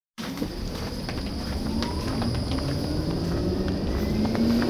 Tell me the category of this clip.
Soundscapes > Urban